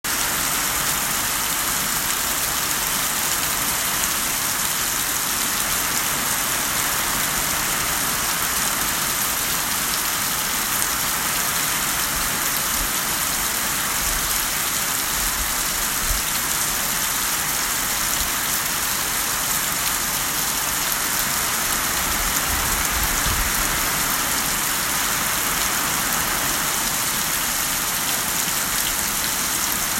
Soundscapes > Nature
Atmospheric river 2 10/24/2021
Heavy first rains. California
nature, atmospheric-river-rain-California, field-recordings, heavy-rain, rural, rain